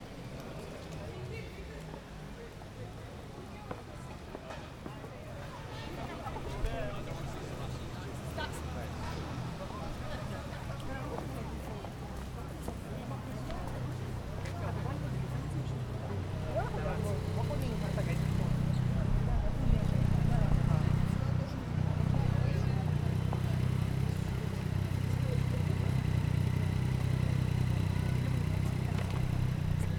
Soundscapes > Urban
LNDN SOUNDS 041
bustling, city, london, market, neighbourhood